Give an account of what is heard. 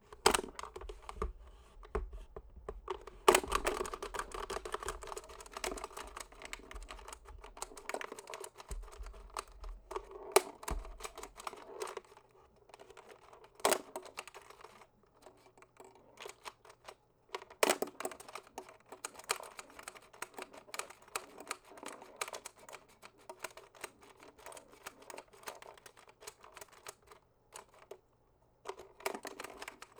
Sound effects > Objects / House appliances
GAMEMisc-Blue Snowball Microphone Desktop Pinball, Being Played Nicholas Judy TDC
Desktop pinball being played.
desktop, Blue-Snowball, pinball, Blue-brand, play, foley